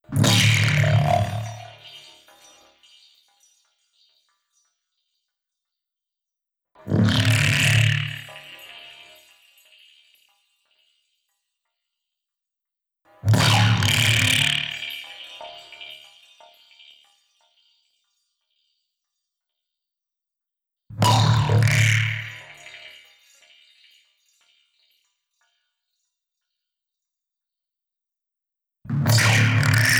Sound effects > Other mechanisms, engines, machines
Sounds of a robotic creature. Made in Ableton using original human vocalizations. Cleaned up in Audacity afterwards.